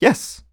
Speech > Solo speech
Joyful - Yes 5
Male, U67, NPC, Video-game, joy, dialogue, Man, singletake, joyful, Human, Single-take, FR-AV2, Tascam, Vocal, happy, Neumann, talk, voice, approval, oneshot, Mid-20s, yes, Voice-acting